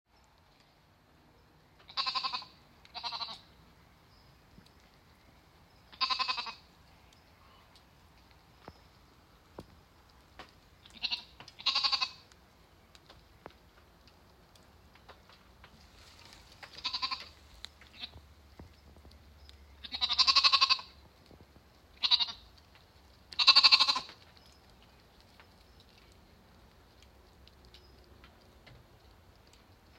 Soundscapes > Nature
Two twins sheepskies
twins, sad, sheep, blues, two